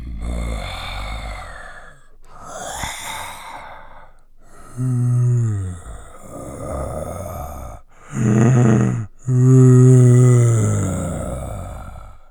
Sound effects > Animals
big monster
Monster moaning and breathing
horror, Monster, scary, woods